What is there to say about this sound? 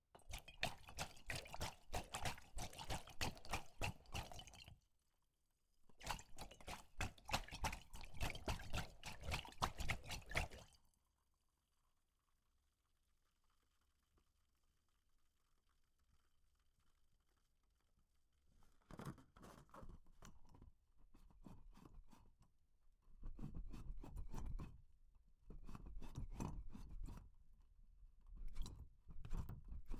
Instrument samples > Other
Water balloon shaker
Subject : A half water half air balloon. Shaken squeezed rubbed and all. Date YMD : 2025 July 01. Location : Albi 81000 Tarn Occitanie France. Hardware : Tascam FR-AV2 Rode NT5 Weather : Sunny, no cloud/wind 38°c 40%humidity. Processing : Trimmed in Audacity.
perc, indoor, fizzle, ballon, to-be-trimmed, fizzling, shaken, kick, NT5, shaker, random, FR-AV2, splash, Cardioid, water, Rode, fluid, to-be-edited, multi-sample, liquid, Tascam, balloon, rubber, Experimental, percussion